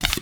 Sound effects > Objects / House appliances
Recording of a person blowing into a straw, loaded with a spitball and firing it
blow, blowing, foley, shot, Spitball, Straw